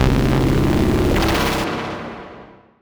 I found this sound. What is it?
Instrument samples > Synths / Electronic
CVLT BASS 88
wobble, bass, subs, stabs, synth, lowend, drops, synthbass, clear, subwoofer, wavetable, low, lfo, bassdrop, sub, subbass